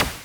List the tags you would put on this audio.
Instrument samples > Percussion
1lovewav
dreak
beat
loop
drums
80s

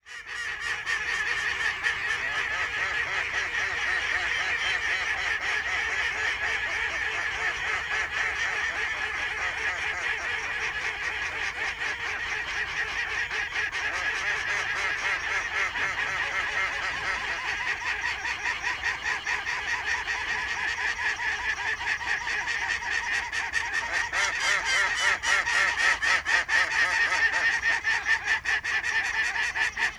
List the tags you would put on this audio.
Soundscapes > Nature
quack
animals
ducks

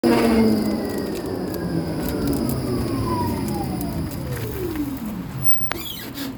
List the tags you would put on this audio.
Sound effects > Vehicles
tram
trans
vehicle

